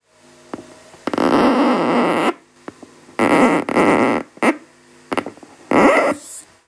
Sound effects > Electronic / Design
Made With Audacity And Recorded With iPhone 12 Pro Max using Voice Memos And Mastered In Audacity (Again) A Vinyl Record Scratches As 33 RPM..